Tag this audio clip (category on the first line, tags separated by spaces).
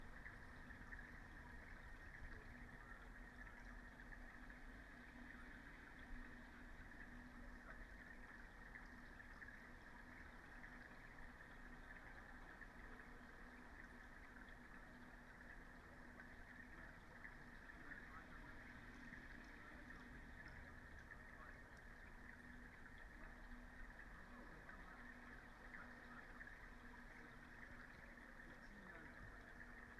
Soundscapes > Nature
weather-data natural-soundscape raspberry-pi sound-installation phenological-recording nature Dendrophone data-to-sound artistic-intervention field-recording soundscape alice-holt-forest modified-soundscape